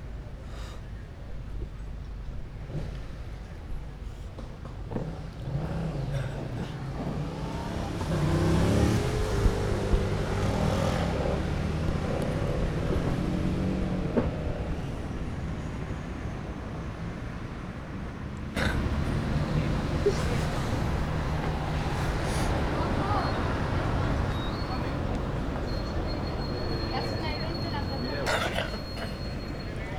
Soundscapes > Urban
20251114 PlacaCarmel Cars Bus Motorbike Machinery Humans Complex
Urban Ambience Recording in collab with Coves del Cimany High School, Barcelona, November 2026. Using a Zoom H-1 Recorder.